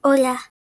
Speech > Solo speech
hola This sound was not made with Artifical Intelligence and is my real voice in real life Recorded and slightly edited in Turbowarp Sound Editor